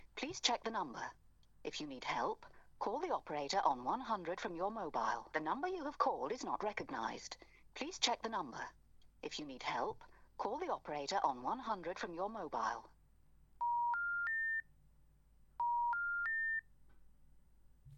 Sound effects > Objects / House appliances
"The Number You Have Called Is Not Recongised" & Dial Tone - United Kingdom
This is a recording of the pre-recorded message that plays when you attempt to call a non-existent or deactivated number in the United Kingdom. This is the official message and not a fictional interpretation.
telephone, smartphone, error, cell